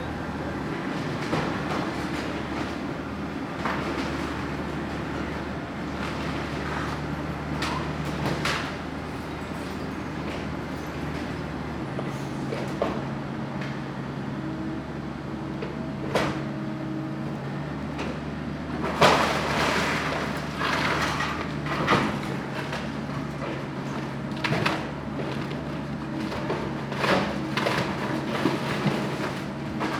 Soundscapes > Urban
A work crew demolishing a house. An excavator tears away at the structure as various other machines and trucks work to haul away the debris. Lots of crisp crunching. Eventually the excavator is able to push the house down while the other workers cheer and celebrate.
outside, crunch, wood, ambience, loud, urban, work, machinery, noise, city, crash, field-recording, destruction, bang, demolition